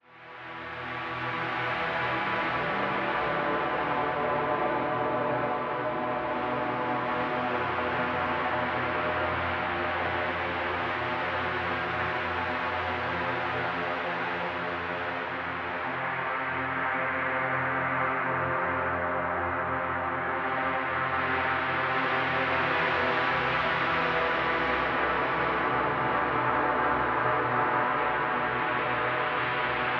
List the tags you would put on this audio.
Music > Other
notch
audacity
flstudio

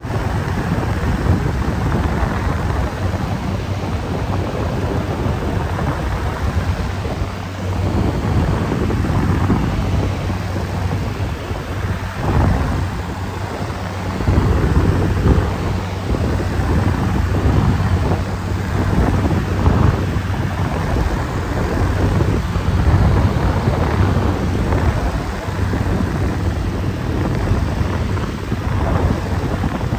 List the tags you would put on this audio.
Sound effects > Objects / House appliances
air
fan

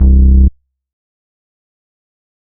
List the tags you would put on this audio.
Instrument samples > Synths / Electronic
bass
synth
vst
vsti

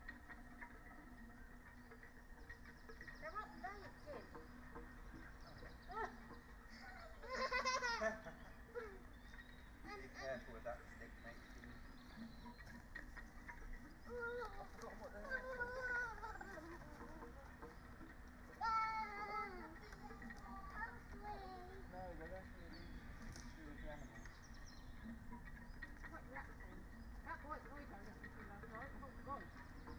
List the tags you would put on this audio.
Soundscapes > Nature
nature
phenological-recording
natural-soundscape
soundscape
weather-data
Dendrophone
artistic-intervention
raspberry-pi
data-to-sound
sound-installation
alice-holt-forest
field-recording
modified-soundscape

